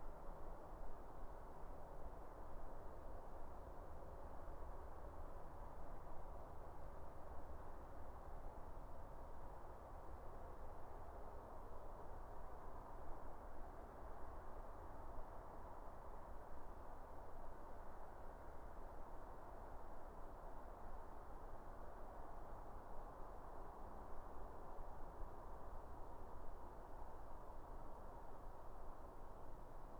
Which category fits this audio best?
Soundscapes > Urban